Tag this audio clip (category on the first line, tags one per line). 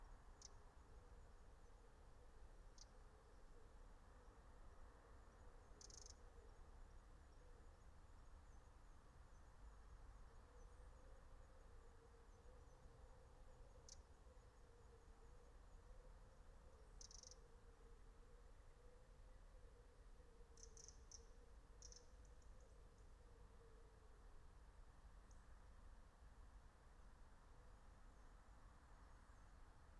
Soundscapes > Nature
raspberry-pi
meadow
field-recording
nature
phenological-recording
alice-holt-forest
soundscape
natural-soundscape